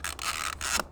Sound effects > Objects / House appliances
COMCam-Blue Snowball Microphone, MCU Camera, Wind Up Auto Winder Nicholas Judy TDC
A camera auto winder winding up.
auto-winder, Blue-brand, Blue-Snowball, camera, canon, dl-9000, foley, wind-up